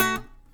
Music > Solo instrument
acoustic guitar quick happy chord 2
acosutic, chord, chords, dissonant, guitar, instrument, knock, pretty, riff, slap, solo, string, strings, twang